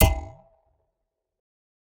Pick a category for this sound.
Sound effects > Experimental